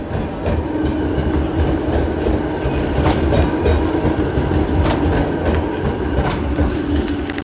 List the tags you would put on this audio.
Sound effects > Vehicles
field-recording
tram

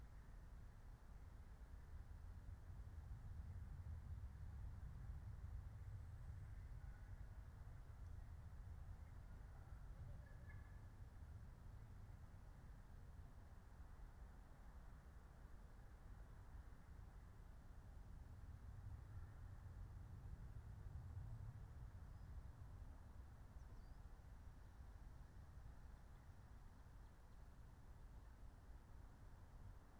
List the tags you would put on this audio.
Soundscapes > Nature
alice-holt-forest
field-recording
natural-soundscape
soundscape

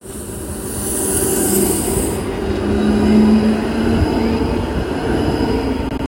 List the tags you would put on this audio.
Sound effects > Vehicles
sunny
tampere
tram

